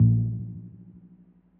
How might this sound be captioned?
Sound effects > Experimental
Steel Fermentation Chamber EQ
Bonk sound, steel container, recording